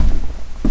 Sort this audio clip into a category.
Sound effects > Objects / House appliances